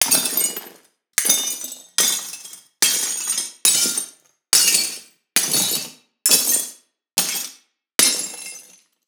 Sound effects > Objects / House appliances
GLASBrk Hammer Smash Mason Jar
broke some mason jars with a hammer in my studio. experimented with blending the SM57 with my DR-07x.
destruction, bottle, smash, jar, window, break, glass